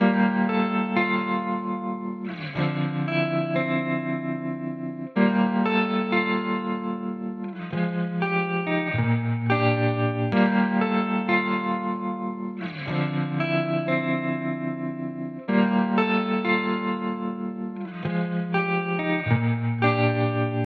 Music > Solo instrument
Guitar Loop - (D Minor) (BPM = 93)
I made these with my electric guitar! Even for business purposes or for your own music!